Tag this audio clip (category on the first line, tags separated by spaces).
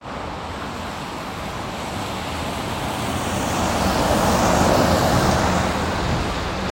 Soundscapes > Urban
bus transportation vehicle